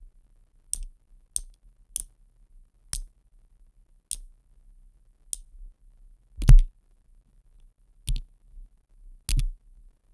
Sound effects > Objects / House appliances
Brush hit
2 brushes hitting
other, Brush, each, Hit